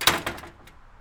Sound effects > Objects / House appliances
Outdoor Fence Closing. Recorded from Zoom H1n